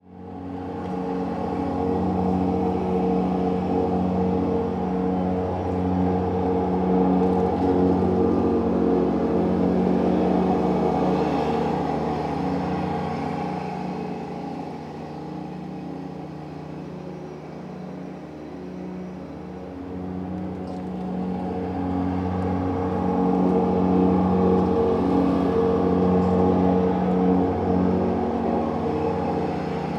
Soundscapes > Other
A recording of a sit on lawn mower being used outside my flat. Semi open window perspective.
outdoor, grass, mower, cutting, machine, lawn